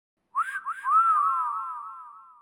Sound effects > Human sounds and actions
A stylized Wolf Whistle
whistling, whistle, wolf, salutation